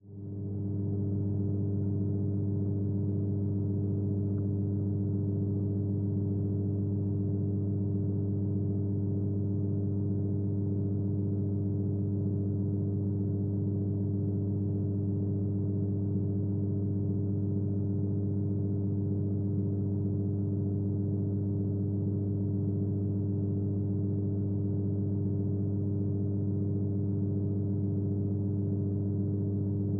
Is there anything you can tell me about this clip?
Sound effects > Other mechanisms, engines, machines
Recorded using a contact mic to a powered on household tower fan.
contact, fan, mic, rumble, vibrating, vibration